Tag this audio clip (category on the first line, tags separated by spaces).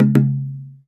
Music > Solo instrument
Crash Custom Cymbal Cymbals Drum Drums FX GONG Hat Kit Metal Oneshot Paiste Perc Percussion Ride Sabian